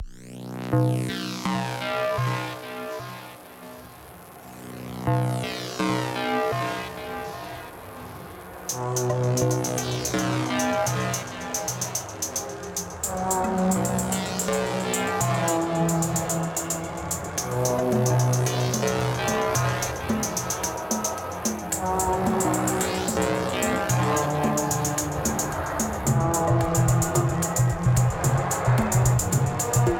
Music > Other
Dark stealthy industrial track with dissonance
beat, clandestine, dark, industrial, sneaky